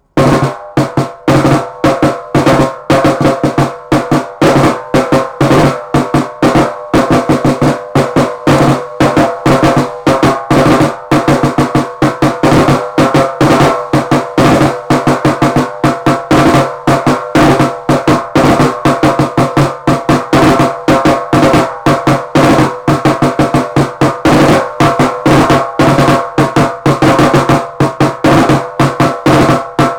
Music > Solo percussion
MUSCPerc-Blue Snowball Microphone, CU Drum, Snare, Parade Nicholas Judy TDC
A parade snare drum.
Blue-brand, snare-drum, snare, drum, Blue-Snowball, parade